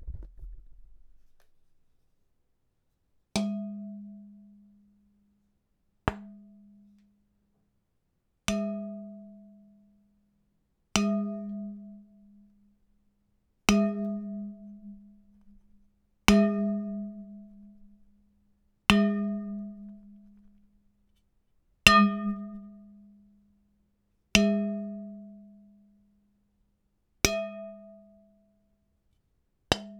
Sound effects > Objects / House appliances
pan cover hit with wooden spoon
Holding a spaghetti pan cover while hitting it with a wooden spoon. Recorded with Zoom H2.